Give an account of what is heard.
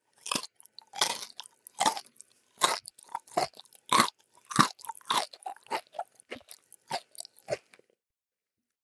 Sound effects > Other
FOODEat Cinematis RandomFoleyVol2 CrunchyBites CrunchyChocolateBite OpenMouth NormalChew 01 Freebie
rustle, handling, plastic, foley, snack, effects, design, bites, bite, SFX, texture, food, crunchy, bag, crunch, recording, chocolate, sound, postproduction